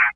Sound effects > Experimental
Analog Bass, Sweeps, and FX-022
alien, analog, analogue, bass, basses, bassy, complex, dark, effect, electro, electronic, fx, korg, machine, mechanical, oneshot, pad, retro, robot, robotic, sample, sci-fi, scifi, sfx, snythesizer, sweep, synth, trippy, vintage, weird